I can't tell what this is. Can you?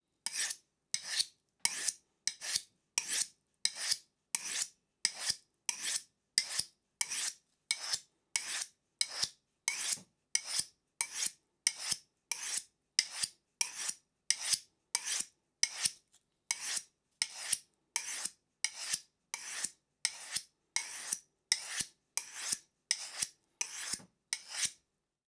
Sound effects > Objects / House appliances
Sharpening a knife with a musat 1
Sharpening a knife with a musat. Recorded in a real kitchen on Tascam Portacapture X8. Please write in the comments where you plan to use this sound. I think this sample deserves five stars in the rating ;-)
ceramics, tool